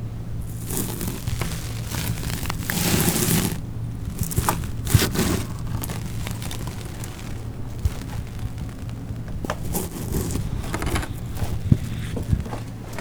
Sound effects > Natural elements and explosions
Spider web - stick - 2
Subject : Removing a spiderweb with a stick. Handheld Rode NT5 microphone with a WS8 Wind-cover. Date YMD : 2025 04 22 Location : Indoor inside a barn Gergueil France. Hardware : Tascam FR-AV2, Rode NT5 WS8 windcover. Weather : Processing : Trimmed and Normalized in Audacity. Maybe some Fade in/out.
FR-AV2, halloween, NT5, Rode, spider-web, spooky, Tascam, web, webbing